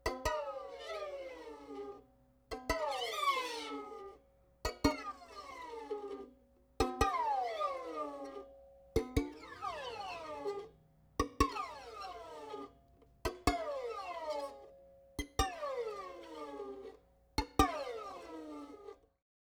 Instrument samples > String
Mandolin Metal Coin Hit and Slide
recorded with Zoom H4n recorder and Sennheiser MKH 416 Shotgun Condenser Microphone metal coin hits the string and slides
slide, sfx, mandolin